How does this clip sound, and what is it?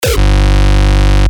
Instrument samples > Percussion
Bass synthed with phaseplant only.